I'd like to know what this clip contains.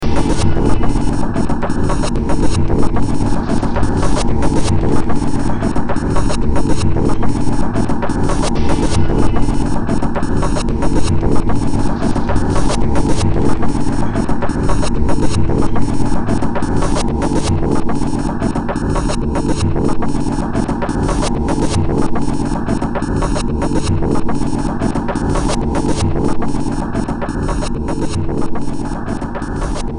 Music > Multiple instruments
Demo Track #3080 (Industraumatic)
Ambient, Cyberpunk, Games